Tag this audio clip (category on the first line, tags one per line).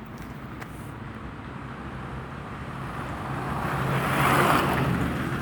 Soundscapes > Urban
Car
CarInTampere
vehicle